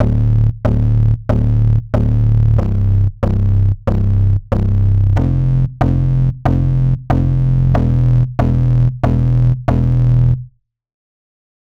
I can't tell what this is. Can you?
Music > Solo instrument
93bpm - PsyTechBass11 Dminor - Master
1 of 3 Variant 1 of PsyTechBass.
techno, loop, Bass, electro, synth